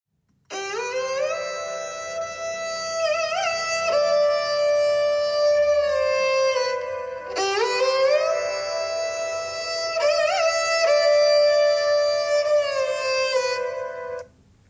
Instrument samples > String
indian string instrument sarangi recorded with live sarangi player on mic.